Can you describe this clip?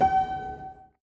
Music > Solo instrument
A grand piano note. Recorded at The Arc.